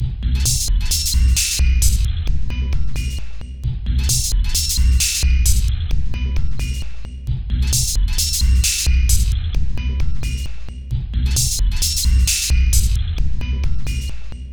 Instrument samples > Percussion
This 132bpm Drum Loop is good for composing Industrial/Electronic/Ambient songs or using as soundtrack to a sci-fi/suspense/horror indie game or short film.
Alien, Ambient, Dark, Drum, Industrial, Loop, Loopable, Packs, Samples, Soundtrack, Underground, Weird